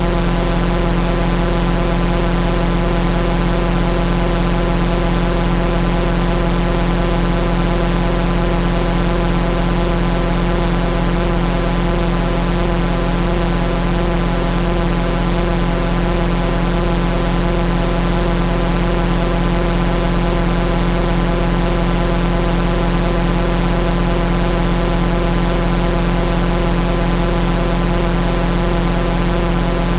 Soundscapes > Synthetic / Artificial
Space Drone 003
Drone sound 003 Developed using Digitakt 2 and FM synthesis
artificial, drone, FM, soundscape, space